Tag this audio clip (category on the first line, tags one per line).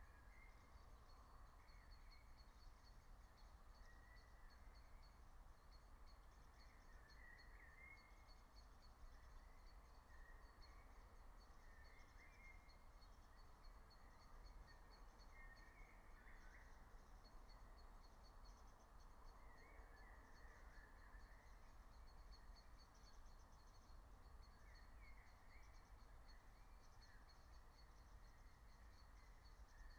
Soundscapes > Nature
raspberry-pi
field-recording
meadow
nature
soundscape
natural-soundscape
phenological-recording
alice-holt-forest